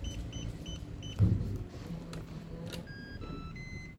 Sound effects > Other mechanisms, engines, machines
MECHLock-Samsung Galaxy Smartphone Automatic Nicholas Judy TDC
An automatic lock.
automatic-lock, beep, lock, Phone-recording, unlock